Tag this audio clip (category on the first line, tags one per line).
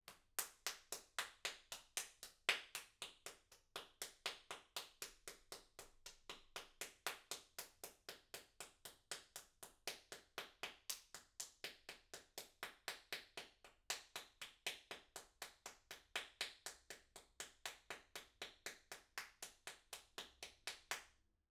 Sound effects > Human sounds and actions
indoor
XY
Solo-crowd
Tascam
FR-AV2
Applauding
Rode
NT5
Applause
AV2
individual
solo
clap